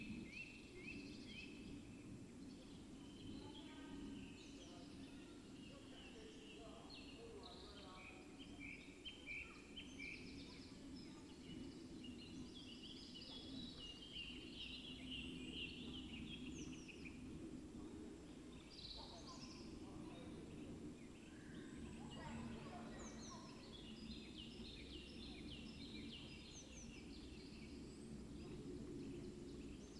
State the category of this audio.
Soundscapes > Nature